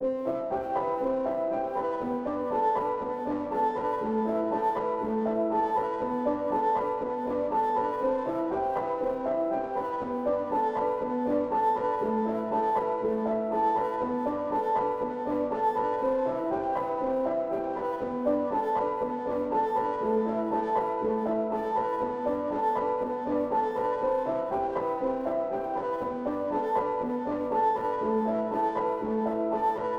Music > Solo instrument
Piano loops 192 efect 3 octave long loop 120 bpm
120, 120bpm, free, loop, music, piano, pianomusic, reverb, samples, simple, simplesamples